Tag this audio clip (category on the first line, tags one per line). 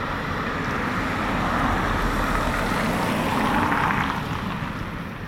Sound effects > Vehicles
Car
Field-recording
Finland